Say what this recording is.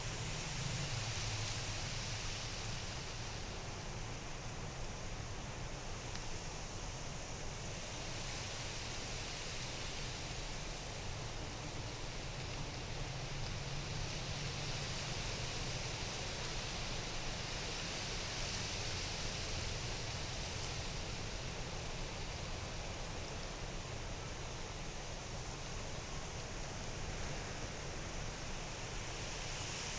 Soundscapes > Nature
Sound taken next to river where long reed-like plants are being blown in the wind. (Some vehicles audible.)